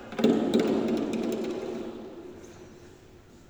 Sound effects > Objects / House appliances
A plastic watering can fell down on the church floor with rebounds. Recorded on Xiaomi MI10 Lite